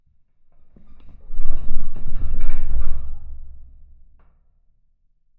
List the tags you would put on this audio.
Sound effects > Other
metallic
vibrating
creak
vent
industrial
creaking
shake
percussion
shaking
metal

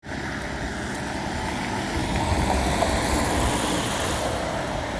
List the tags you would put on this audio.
Sound effects > Vehicles
auto
city
street

